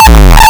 Instrument samples > Percussion
All samples used from Flstudio 2025 original sample pack. Processed with ZL EQ, Plasma, Waveshaper.
Hardcore, Zaag, Hardstyle, Uptempo, Zaagkick, Kick